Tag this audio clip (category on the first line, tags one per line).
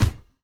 Instrument samples > Percussion
Ball
Balling
basketball
Beat
Beats
Classic
Drum
Free
Headphones
HH
Hip
Hoops
Hop
Kit
Live
Logo
Moves
music
Nova
Novahoops
Novasound
Percussion
Rap
Sound
Sports
Trap
Vinyl